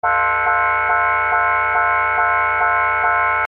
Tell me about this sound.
Sound effects > Electronic / Design
Sci-Fi/Horror Alarm 6
Sounds like something from old Doctor Who.
dr-who, fx, warning